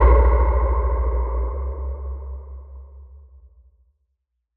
Sound effects > Electronic / Design
RATTLING LOW RUMBLY EXPLOSION
BASSY, BOOM, DEEP, DIFFERENT, EXPERIMENTAL, EXPLOSION, HIPHOP, HIT, IMPACT, INNOVATIVE, LOW, RAP, RATTLING, RUMBLING, TRAP, UNIQUE